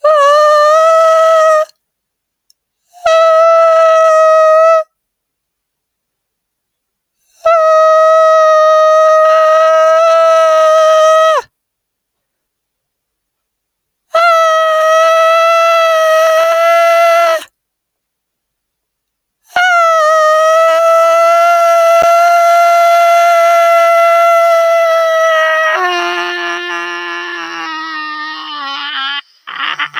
Speech > Solo speech
I made this sound when i remembered Deltarune tomorrow
I remembered Deltarune tomorrow can actually be said tomorrow (at the time of uploading), and I made this noise in response to brain